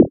Instrument samples > Synths / Electronic
BWOW 8 Eb
additive-synthesis, bass